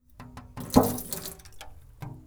Sound effects > Other mechanisms, engines, machines
Woodshop Foley-090
bam, bang, boom, bop, crackle, foley, fx, knock, little, metal, oneshot, perc, percussion, pop, rustle, sfx, shop, sound, strike, thud, tink, tools, wood